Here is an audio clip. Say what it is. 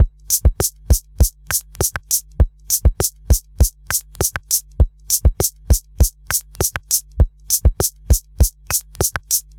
Instrument samples > Percussion
100 Welson Loop 01
Loops and one-shots made using Welson Super-Matic Drum Machine
100bpm, Hi-Hats, Drums, Retro, DrumMachine, Loop, Synth, Vintage, Electronic, Electro, Rare, DrumLoop